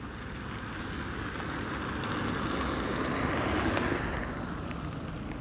Soundscapes > Urban
Audio of car passing by. Location is Tampere, Hervanta. Recorded in winter 2025. No snow, wet roads, not windy. Recorded with iPhone 13 mini, using in-built voice memo app.